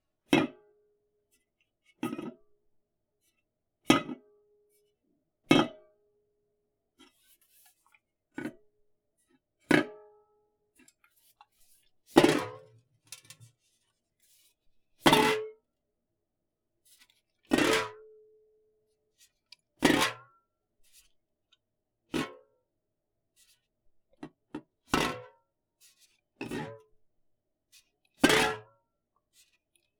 Sound effects > Objects / House appliances
soda can full
Picking up and dropping a full soda can on a countertop multiple times.
aluminium, aluminum, can, drop, impact, liquid, metal, soda, thud